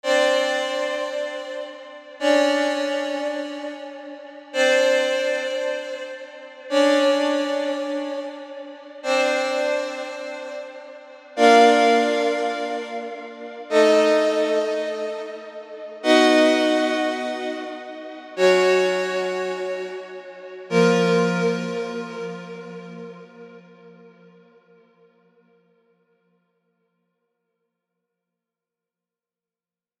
Music > Solo instrument
One of my better music clips (made with fl studio)